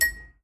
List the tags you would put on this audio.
Sound effects > Objects / House appliances
ding glass foley Phone-recording

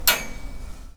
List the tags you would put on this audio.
Sound effects > Objects / House appliances
Ambience Atmosphere Bang Bash Clang Clank Dump dumpster Environment FX Junk Junkyard Machine Metal Metallic Perc Percussion rattle Robot Robotic rubbish scrape SFX Smash tube

